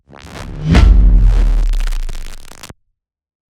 Sound effects > Electronic / Design
PLASMA IMPACT ONE
A cinematic plasma blast made from natural sounds with artificial processing.